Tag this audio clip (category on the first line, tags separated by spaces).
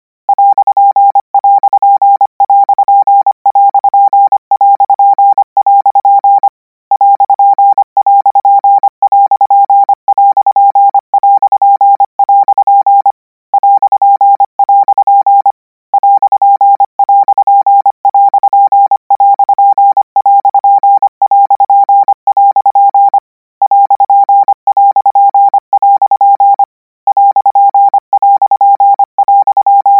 Sound effects > Electronic / Design
radio code morse symbols codigo